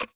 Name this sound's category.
Sound effects > Other